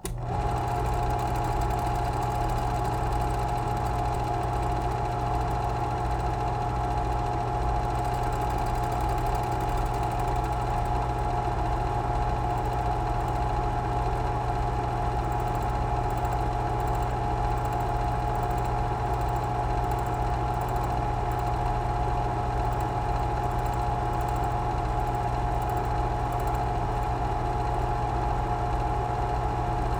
Sound effects > Other mechanisms, engines, machines
COMAv-Blue Snowball Microphone, MCU Projector, Film, 8mm, Super 8, Switch On, Run, Off Nicholas Judy TDC
Bell and Howell 8mm-Super 8 film projector switching on, running and switching off.
Blue-Snowball, fan, film, projector, run, switch-off